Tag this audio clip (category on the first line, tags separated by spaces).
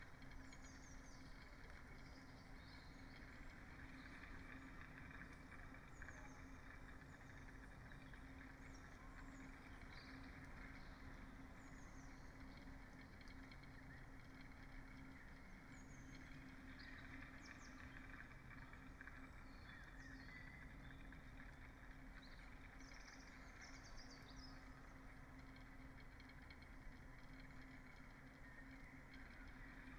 Soundscapes > Nature
natural-soundscape field-recording nature modified-soundscape sound-installation weather-data raspberry-pi soundscape phenological-recording alice-holt-forest data-to-sound Dendrophone artistic-intervention